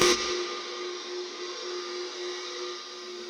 Sound effects > Experimental
snap crack perc fx with verb -glitchid 005
abstract, alien, clap, crack, edm, experimental, fx, glitch, glitchy, hiphop, idm, impact, impacts, laser, lazer, otherworldy, perc, percussion, pop, sfx, snap, whizz, zap